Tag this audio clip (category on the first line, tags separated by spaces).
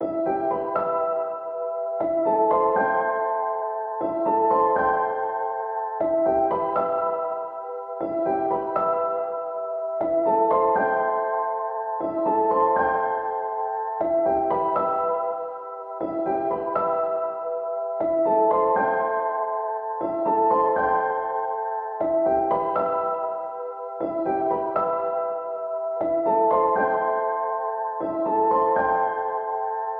Music > Solo instrument
reverb piano 120 loop